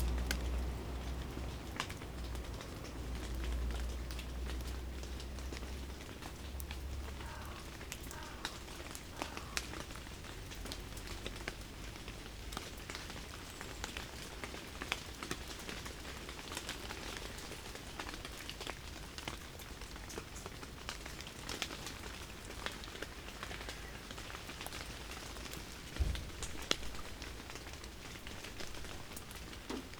Soundscapes > Urban
Subject : Recording the morning church bells in Gergueil from under a big chestnut tree. Date YMD : 2025 September 01 Around 06h58 Location : Gergueil 21410 Bourgogne-Franche-Comté Côte-d'Or France. Sennheiser MKE600 with stock windcover. P48, no filter. Weather : Slight rain. Processing : Trimmed and normalised in Audacity. Notes : You can hear a bus park near the town hall and leave its engine on. It had more patience than I did. (I was standing in an akward position holding the H2n.)